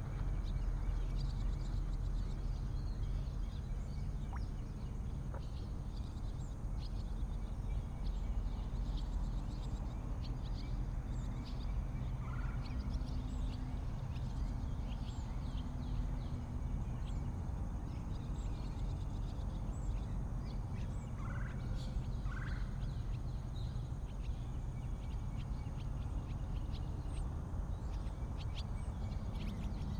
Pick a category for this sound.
Soundscapes > Urban